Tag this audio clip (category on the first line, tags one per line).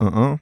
Speech > Solo speech
2025
Adult
Calm
FR-AV2
Generic-lines
Hypercardioid
july
Male
mid-20s
MKE-600
MKE600
no
nuh
Sennheiser
Shotgun-mic
Shotgun-microphone
Single-mic-mono
Tascam
uhn
VA
Voice-acting